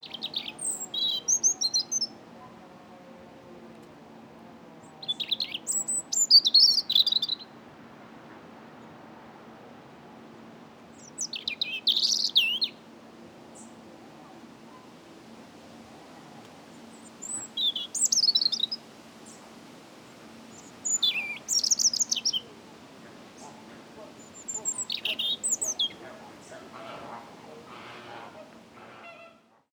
Soundscapes > Nature
young robin closeup
A young robin sings 6 times Close-up, mic is 2 meters from the bird Background are a kind of fan, a faraway chainsaw and pink flamengo at the end.
robin, field-recording, bird, close-up